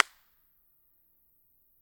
Soundscapes > Other
I&R Albi Pratgraussals SE Lake - Hand clamp - NT5o
impulse-response, hand-clap, Rode, convolution, convolution-reverb, NT5-o, FR-AV2, impulse